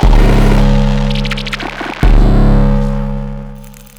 Music > Solo percussion
Industrial Estate 25
120bpm, Ableton, chaos, industrial, loop, soundtrack, techno